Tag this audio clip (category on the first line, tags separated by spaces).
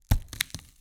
Sound effects > Experimental
foley onion punch thud vegetable